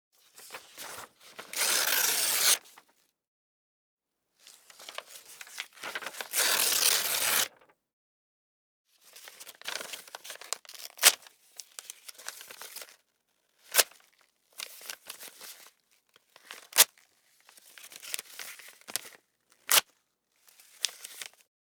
Sound effects > Objects / House appliances
The sound of paper tearing. Recorded using Tascam Portacapture X8. Please write in the comments where you plan to use this sound. I think this sample deserves five stars in the rating ;-)